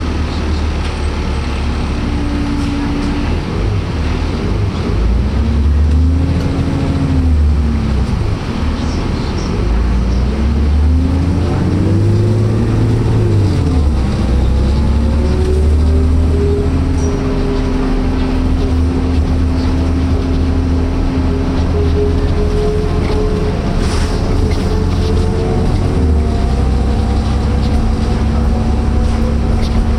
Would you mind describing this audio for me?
Sound effects > Vehicles
I recorded the engine and transmission sounds when riding the Mississauga Transit/MiWay buses. This is a recording of a 2005 New Flyer D40LF transit bus, equipped with a Cummins ISL I6 diesel engine and Voith D864.3E 4-speed automatic transmission. This bus was retired from service in 2023.